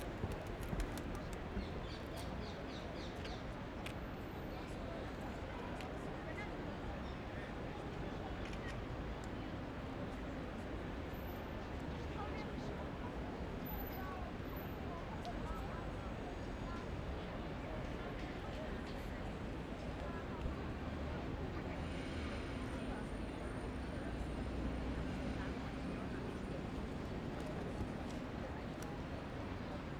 Soundscapes > Urban
AMB Barcelona, Spain busy street, tourist, traffic LR
Barcelona, busy square with tourists, locals and traffic. You can hear someone taking a picture near by and a dove flying away
ambience, barcelona, birds, cars, city, doves, field-recording, people, square, street, traffic